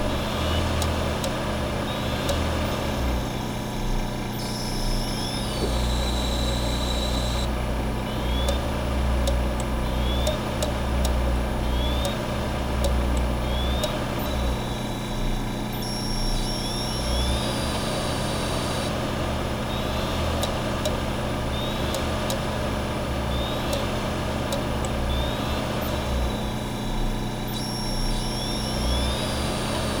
Sound effects > Objects / House appliances
Sound clip of an old, bad CD-ROM drive in a late 90's-era PC continuously failing to read a disc, resulting in a long bout of varying mechanical noises. Also features sounds of the computer fans. Recorded with a USB microphone because that's what I have on hand on my desk
electronics, mechanical, motor, servo, fan, electric, clicking